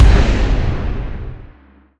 Instrument samples > Percussion
A top-tier weak orchestral breathclash cymbal with partial alien noise (it can become more realistic attenuated, balance-biased and combined with a different crash you like) for rock/metal/jazz music use. A merger of older low-pitched files (search my crashes folder). tags: pseudogong gong _________ I focus on sounds usable in rock/metal/jazz/pop/electro/etc.
china, clash, low-pitched, Meinl, multicrash, Sabian, sinocrash, smash, spock, Stagg, Zultan